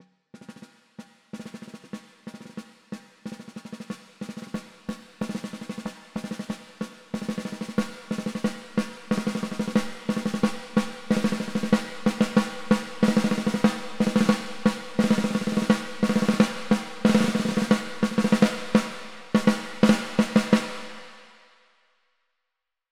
Music > Solo percussion
snare Processed - marching beat - 14 by 6.5 inch Brass Ludwig
sfx, snare, flam, crack, beat, realdrum, realdrums, hit, kit, drum, brass, roll, percussion, rimshots, drums, rim, ludwig, reverb, perc, snaredrum, drumkit, fx, acoustic, hits, oneshot, snareroll, snares, processed, rimshot